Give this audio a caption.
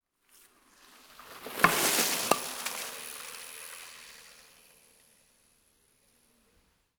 Sound effects > Other mechanisms, engines, machines
Bicycle riding in the forest, with a yeeted object. Location: Poland Time: November 2025 Recorder: Zoom H6 - XYH-6 Mic Capsule
bicycle, impact, bike, stereo, forest, field-recording